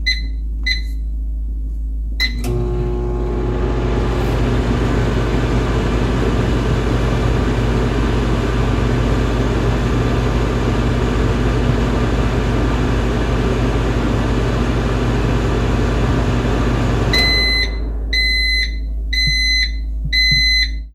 Sound effects > Objects / House appliances
MACHAppl-Samsung Galaxy Smartphone Microwave, Press Buttons, Start, Run, Stop, Beeping Nicholas Judy TDC
A microwave pressing buttons, starting, running and stopping with beeps.